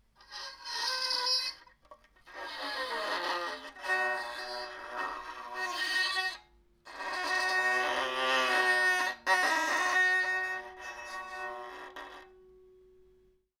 Instrument samples > String
Bowing broken violin string 15
Bowing the string(s) of a broken violn with a cello bow.
beatup, bow, broken, creepy, horror, strings, uncomfortable, unsettling, violin